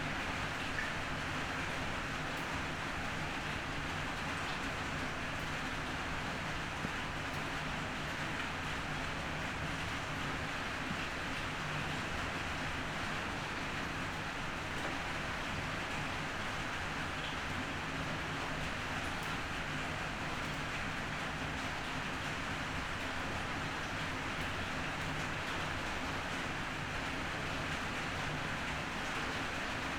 Sound effects > Natural elements and explosions
250723 235811 PH Strong rain on small backyard and metallic roof
Strong rain on small backyard and metallic roof (loopable). Recorded in July 2025 with a Zoom H6essential (built-in XY microphones). Fade in/out applied in Audacity.
atmosphere, downpour, white-noise, roof, dripping, backyard, rainy, Philippines, night, rainfall, metallic, relaxing, ambience, loopable, raining, cement